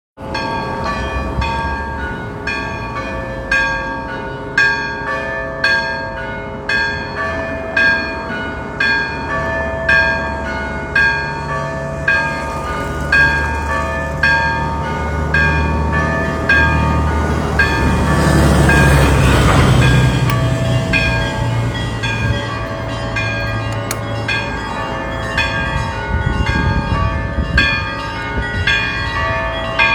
Music > Solo instrument
Ringing in the city. Recorded on the phone.